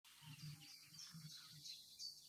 Sound effects > Animals
Songbirds - Common Chiffchaff; Distant
This song of a common chiffchaff was recorded May 8, 2024 at Wicken Fens during my visit to England. I made four recordings while there, with one recording having been posted on Critter Zone earlier.
chirp, european-birds, foreign, chiffchaff, british-birds